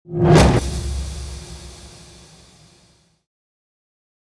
Sound effects > Other
Sound Design Elements Impact SFX PS 100
A powerful and cinematic sound design impact, perfect for trailers, transitions, and dramatic moments. Effects recorded from the field. Recording gear-Tascam Portacapture x8 and Microphone - RØDE NTG5 Native Instruments Kontakt 8 REAPER DAW - audio processing
audio, bang, blunt, cinematic, collision, crash, design, effects, explosion, force, game, hard, heavy, hit, impact, percussive, power, rumble, sfx, sharp, shockwave, smash, sound, strike, thud, transient